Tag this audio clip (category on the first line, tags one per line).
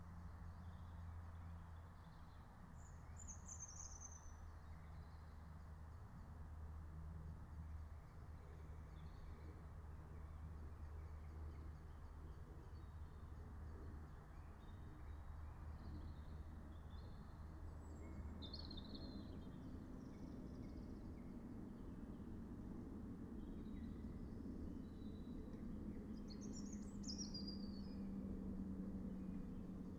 Soundscapes > Nature
alice-holt-forest
field-recording
meadow
natural-soundscape
nature
phenological-recording
raspberry-pi
soundscape